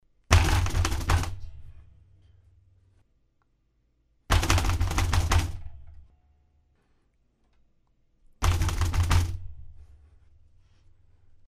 Sound effects > Objects / House appliances
The sound of someone rattling their keyboard and desk. Great for accentuating an over-the-top moment of rage in a video game or a comedy moment in animation.